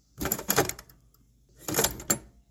Sound effects > Other mechanisms, engines, machines
COMAv-Samsung Galaxy Smartphone, CU Projector, Slide, Changer Arm, Out, In Nicholas Judy TDC
A slide projector changer arm sliding out and in.
changer-arm, foley, out, Phone-recording, slide, slide-projector